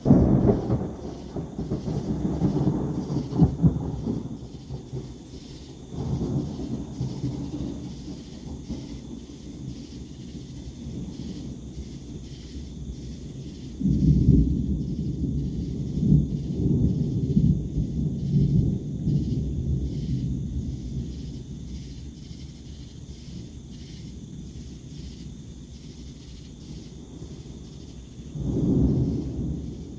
Soundscapes > Nature
STORM-Samsung Galaxy Smartphone, CU Thunderstorm, Crackle, Boom, Rumble, Distant Bugs Nicholas Judy TDC
Thunderstorm crackling, booming and rumbling with night insect noises.
crackle, bugs, night, noise, Phone-recording, insect, thunderstorm, rumble, thunder, boom